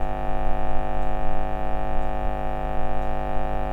Sound effects > Objects / House appliances
Fridge electrical sound
appliance, electrical